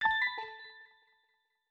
Sound effects > Electronic / Design
Butterflow Pickup Sound / UI Success
pure, ui, interfaz, glamour, menu, click, app, button, cheerful, juego, positive, interface, success, ux, videojuego, happy, glitter, bells, positivo, boton, game, glockenspiel, blessing, metallic, videogame, pickup